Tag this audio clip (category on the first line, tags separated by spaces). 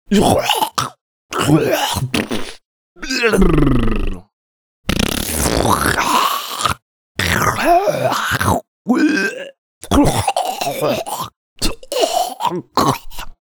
Speech > Solo speech
choking scary